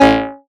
Instrument samples > Synths / Electronic
TAXXONLEAD 2 Db
Synthesized instrument samples